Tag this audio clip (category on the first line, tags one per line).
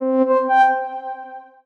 Sound effects > Electronic / Design
alert
button
digital
interface
menu
notification
options
UI